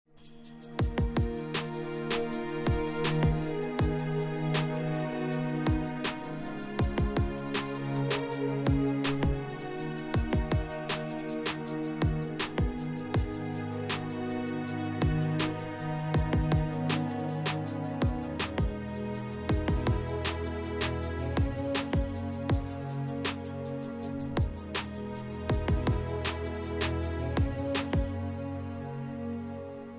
Music > Multiple instruments
music track with beats 3
Music song track with beats . This beautiful soundtrack, I hope you like it.